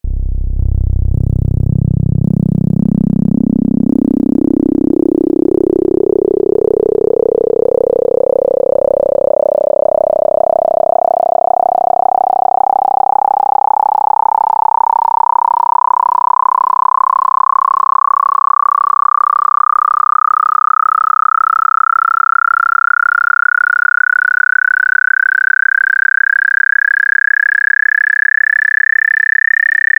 Instrument samples > Synths / Electronic
06. FM-X RES1 SKIRT7 RES0-99 bpm110change C0root
FM-X, MODX, Montage, Yamaha